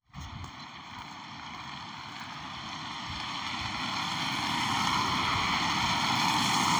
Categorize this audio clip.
Sound effects > Vehicles